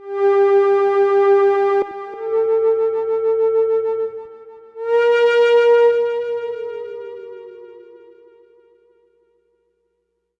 Sound effects > Experimental
Analog Bass, Sweeps, and FX-058
electro, retro, mechanical, analog, sweep, vintage, sci-fi, basses, pad, synth, robot, analogue, trippy, alien, weird, oneshot, dark, bassy, effect, robotic